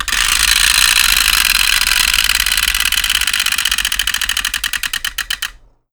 Sound effects > Objects / House appliances
TOYMech-Blue Snowball Microphone, CU Wind Up Teeth Chattering Nicholas Judy TDC
A wind up teeth chattering.
Blue-brand, Blue-Snowball, cartoon, chatter, teeth, toy, wind-up